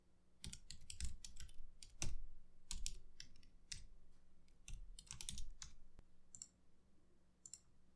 Sound effects > Electronic / Design
Slow, deliberate typing on a computer keyboard.